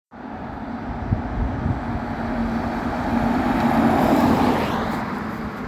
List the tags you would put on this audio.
Sound effects > Vehicles
wet-road
car
asphalt-road
studded-tires
passing-by
moderate-speed

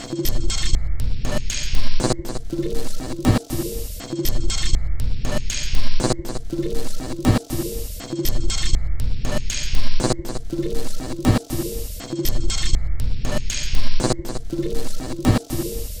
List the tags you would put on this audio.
Instrument samples > Percussion
Loopable
Dark
Industrial
Soundtrack
Ambient
Loop
Drum
Weird
Samples
Underground
Alien
Packs

